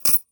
Sound effects > Other
Reminiscent of "that" game...but meticulously crafted from original sources.